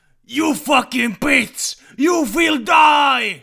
Speech > Solo speech
You fucking bitch you will die!
Recorded with Rode NT1-A
horror, human, male, man, movies, voice, yelling